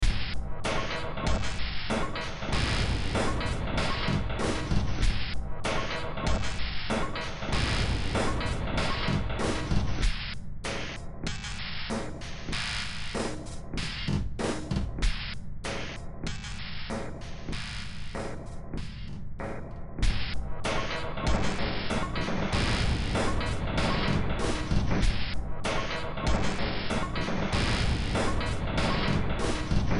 Music > Multiple instruments
Demo Track #2962 (Industraumatic)
Ambient, Cyberpunk, Games, Horror, Industrial, Noise, Sci-fi, Soundtrack, Underground